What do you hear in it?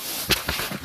Instrument samples > Other
Recorded in Ricardo Benito Herranz Studio